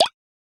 Sound effects > Objects / House appliances
Masonjar Shake 6 SFX
bloop, mason-jar, splash, water